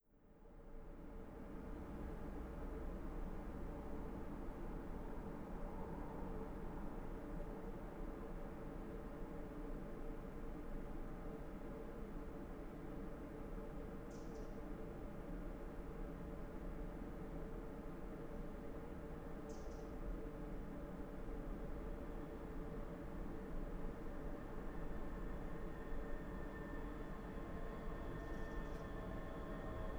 Soundscapes > Urban
[LOUD] Train pulling into station and away again, quiet ambience, loud train, musical
Beautifully musical sounds emanating from this scotrail train heading for Edinburgh, almost orchestral. Record with Tascam Dr-05x.